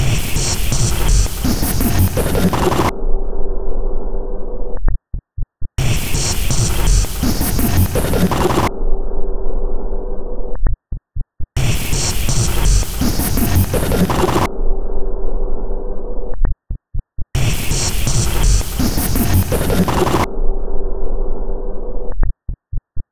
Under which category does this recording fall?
Instrument samples > Percussion